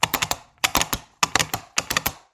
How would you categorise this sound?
Sound effects > Animals